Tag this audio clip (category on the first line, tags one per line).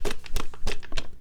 Sound effects > Objects / House appliances
carton
click
plastic